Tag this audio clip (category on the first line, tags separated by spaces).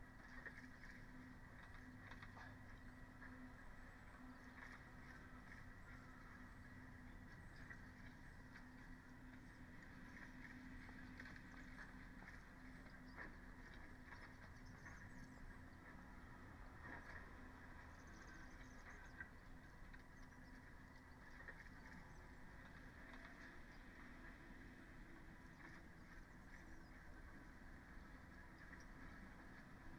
Soundscapes > Nature
alice-holt-forest artistic-intervention nature phenological-recording data-to-sound sound-installation Dendrophone natural-soundscape raspberry-pi modified-soundscape soundscape weather-data field-recording